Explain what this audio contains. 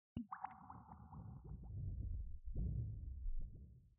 Sound effects > Electronic / Design
A WaterDroplet sound made with U-he Zebra and processed through various GRM plugins, also the Reverb is made using The Valhalla plugins
Droplet, Aquatic, Huge, Water, liquid, wet